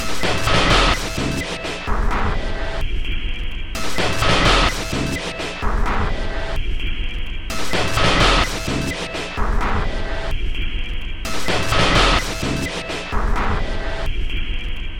Instrument samples > Percussion
This 128bpm Drum Loop is good for composing Industrial/Electronic/Ambient songs or using as soundtrack to a sci-fi/suspense/horror indie game or short film.
Alien, Ambient, Dark, Drum, Industrial, Loop, Loopable, Packs, Samples, Soundtrack, Underground, Weird